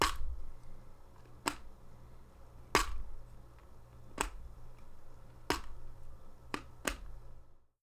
Sound effects > Objects / House appliances
FOLYProp-Blue Snowball Microphone Tic Tac Container, Lift Flap, Open, Close Nicholas Judy TDC
A Tic Tac container lift flap opening and closing.